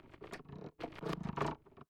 Sound effects > Objects / House appliances
WoodDoor Creak 02
Short creak sound resulting from a moving or deformation of an old wooden door.
wooden, sound, old, resulting, or, moving, deformation, creak, Short, from, a, door